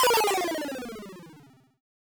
Sound effects > Electronic / Design
8-bit explosion ARP
8-bit arp that i created and processed in DAW; 8-bit explosion sound effect AKA "piripiripiripiripiri..." sound effect. Ы.